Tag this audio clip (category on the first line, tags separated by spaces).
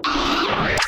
Sound effects > Experimental
snap pop sfx fx perc idm laser whizz experimental impact glitchy alien lazer glitch edm otherworldy clap zap impacts crack abstract percussion hiphop